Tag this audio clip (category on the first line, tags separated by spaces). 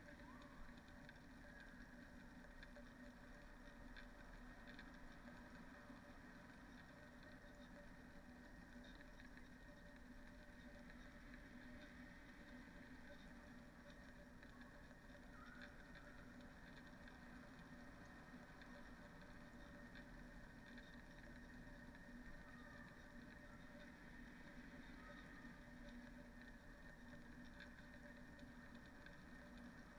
Soundscapes > Nature
natural-soundscape raspberry-pi sound-installation